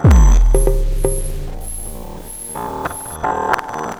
Music > Other
Industrial Estate 20
From a pack of samples created with my modular system and optimized for use in Ableton Live. The "Industrial Estate" loops make generous use of metal percussion, analog drum machines, 'micro-sound' techniques, tape manipulation / digital 'scrubbing', and RF signals. Ideal for recycling into abrasive or intense compositions across all genres of electronic music.
120bpm, Ableton, chaos, industrial, loop, soundtrack, techno